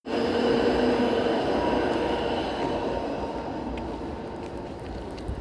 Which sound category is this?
Soundscapes > Urban